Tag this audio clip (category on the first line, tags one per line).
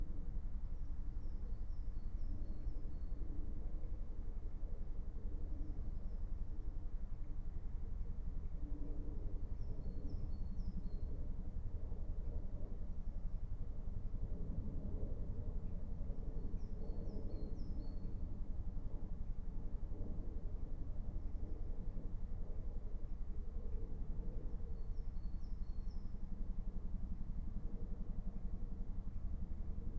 Soundscapes > Nature
field-recording
meadow
phenological-recording
raspberry-pi
soundscape
nature
alice-holt-forest
natural-soundscape